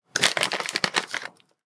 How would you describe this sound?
Sound effects > Other
FOODEat Cinematis RandomFoleyVol2 CrunchyBites Food.Bag TakeOneSaltyStickOut Freebie
bag, bite, bites, crunch, crunchy, design, effects, foley, food, handling, plastic, postproduction, recording, rustle, salty, SFX, snack, sound, sticks, texture